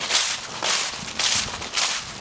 Sound effects > Human sounds and actions
Sound of the leaves as you walk. This sound was recorded by me using a Zoom H1 portable voice recorder.